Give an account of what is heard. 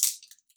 Instrument samples > Percussion
Cellotape Percussion One Shot20
sample, IDM, sounds, texture, samples, adhesive, organic, lo-fi, ambient, creative, unique, layering, shot, found, cellotape, one, electronic, shots, DIY, tape, sound, foley, cinematic, glitch, experimental, percussion, design, drum, pack